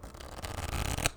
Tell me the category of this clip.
Sound effects > Objects / House appliances